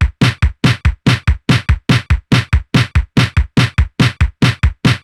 Instrument samples > Percussion
1 drumloop for u dude?